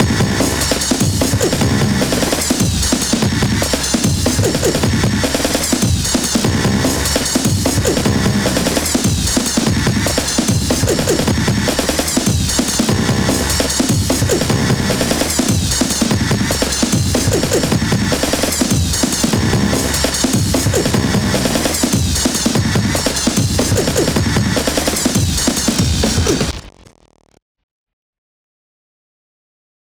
Music > Other
tentieth break fx
breaks breaks breaks149 bpm
breakbreakcore, jungle